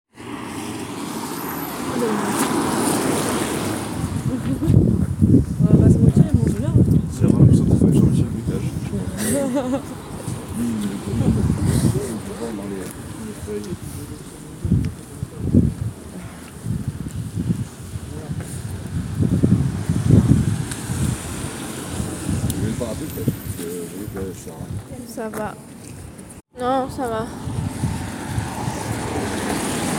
Soundscapes > Urban
car passing by